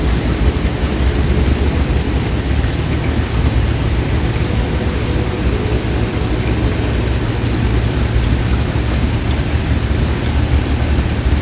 Sound effects > Vehicles
TRNHorn-Samsung Galaxy Smartphone Distant, In Rain Nicholas Judy TDC
A distant train horn in rain.
horn, Phone-recording, train